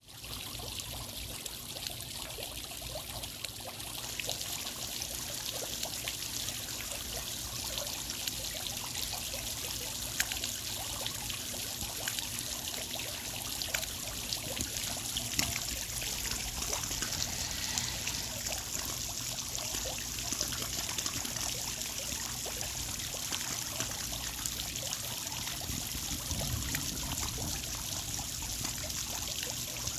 Sound effects > Natural elements and explosions
WATRLap-Samsung Galaxy Smartphone, MCU Sprinklers Trickling, Swimming Pool Nicholas Judy TDC
Water sprinklers trickling from a swimming pool.
Phone-recording, sprinkler, swimming-pool, trickle, water